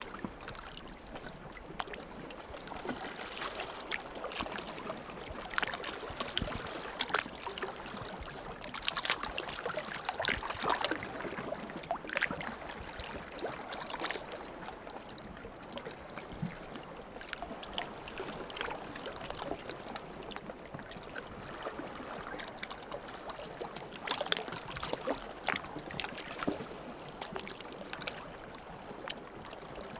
Soundscapes > Nature
Sea waves crashing against rocks. Recorded near water with a Zoom H1 essential.